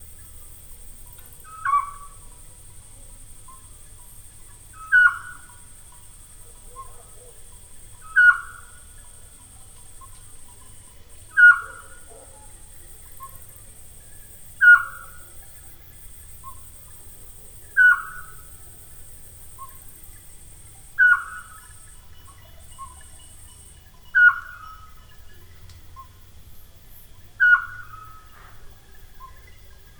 Soundscapes > Nature
A recording of a several scops owls, one close by, and several others more distant. The recording was made on the island of Crete in the middle of the night using a pair of Primo EM272s. The melodic shape of the hoots of all owls are similar but are offset across a range of a few semitones. During a series of hoots, individual hoots are spaced about five seconds apart. Scops owls are small, often heard in rural areas of Crete but are rarely seen. Also captured in the recording are various nocturnal insects, bells on sheep or goats and occasional dog barks.